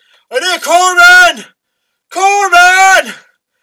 Speech > Solo speech
Soldier Corpsman
Soldier Yelling for a Medic
Battle, Scream, War, Medic, Combat